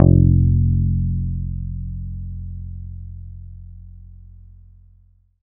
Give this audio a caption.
Instrument samples > String
One octave of real bass guitar recorded with a pick. The sound is completely dry and unprocessed, so you can shape it any way you like.
guitar, picked